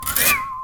Sound effects > Other mechanisms, engines, machines
Handsaw Pitched Tone Twang Metal Foley 35
Handsaw fx, tones, oneshots and vibrations created in my workshop using a 1900's vintage hand saw, recorded with a tascam field recorder
foley, fx, handsaw, hit, household, metal, metallic, perc, percussion, plank, saw, sfx, shop, smack, tool, twang, twangy, vibe, vibration